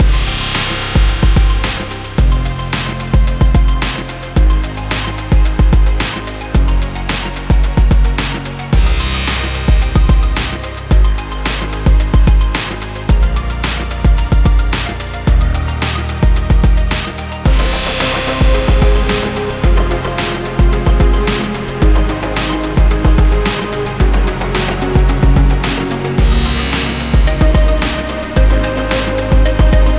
Music > Multiple instruments
Sci-Fi Beat (Space Distortion, 110 BM, 16 bars)
110-bpm, 110-bpm-8bars, 110-bpm-8bars-beat, 110bpm-beat, 110-bpm-edm-beat, dasdf, distorted-edm, edm, edm-beat, electro, electronic, noisy-edm, processed, sci-fi, sci-fi-beat, sci-fi-edm, sci-fi-groove